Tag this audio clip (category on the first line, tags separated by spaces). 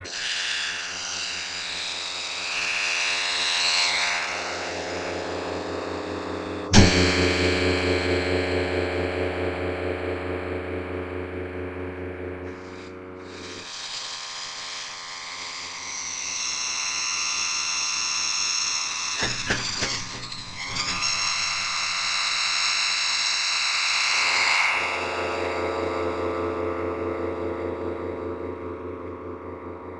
Sound effects > Objects / House appliances
banging impact violin